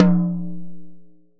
Music > Solo percussion
Hi Tom- Oneshots - 0- 10 inch by 8 inch Sonor Force 3007 Maple Rack
kit, hitom, instrument, tomdrum, velocity, oneshot, drumkit, acoustic, percs, beat, beats, drum, perc, toms, studio, hi-tom, percussion, flam, roll, fill, rim, rimshot, tom, beatloop, drums